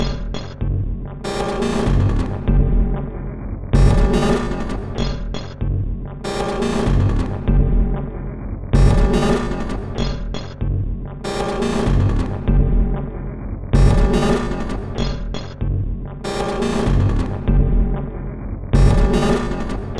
Instrument samples > Percussion
This 96bpm Drum Loop is good for composing Industrial/Electronic/Ambient songs or using as soundtrack to a sci-fi/suspense/horror indie game or short film.
Weird, Soundtrack, Alien, Samples, Loop, Loopable, Ambient, Drum, Industrial, Packs, Underground, Dark